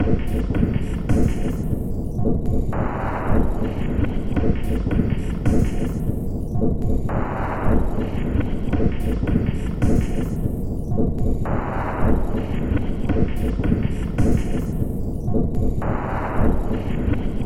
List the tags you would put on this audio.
Instrument samples > Percussion
Samples Packs Loopable

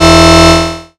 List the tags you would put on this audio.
Instrument samples > Synths / Electronic
fm-synthesis bass

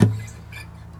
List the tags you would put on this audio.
Music > Solo instrument
knock note acoustic sfx plucked

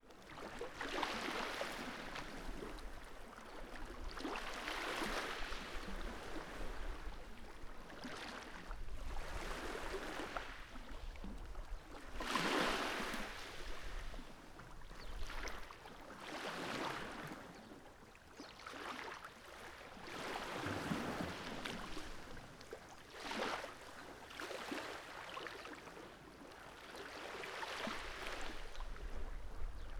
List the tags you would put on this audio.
Soundscapes > Nature
freesound20 ambience

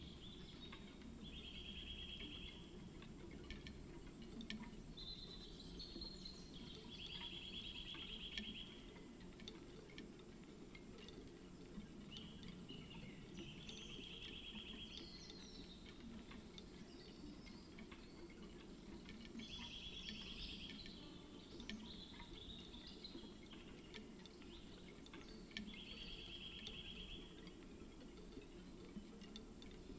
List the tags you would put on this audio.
Soundscapes > Nature
raspberry-pi modified-soundscape sound-installation data-to-sound natural-soundscape Dendrophone artistic-intervention soundscape alice-holt-forest field-recording phenological-recording weather-data nature

